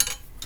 Sound effects > Other mechanisms, engines, machines
metal shop foley -185
oneshot, pop, wood, tools, perc, boom, thud, little, rustle, knock, sfx, crackle, bop, sound, foley, shop, strike, fx, percussion, bang, metal, tink, bam